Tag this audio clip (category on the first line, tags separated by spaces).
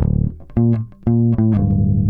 Music > Solo instrument
bass bassline basslines blues chords chuny electric electricbass funk fuzz harmonic harmonics low lowend note notes pick pluck riff riffs rock slap slide slides